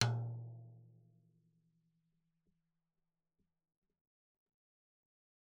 Music > Solo percussion
Med-low Tom - Oneshot 67 12 inch Sonor Force 3007 Maple Rack
acoustic, beat, drum, drumkit, drums, flam, kit, loop, maple, Medium-Tom, med-tom, oneshot, perc, percussion, quality, real, realdrum, recording, roll, Tom, tomdrum, toms, wood